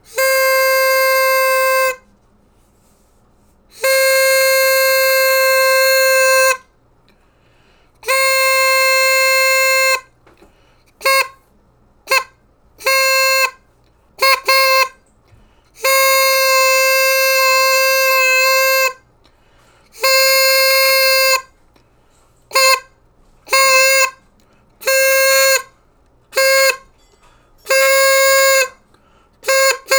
Sound effects > Objects / House appliances
HORNCele-Blue Snowball Microphone, CU Party Horn Blowing Nicholas Judy TDC
A party horn blowing.
blow, Blue-brand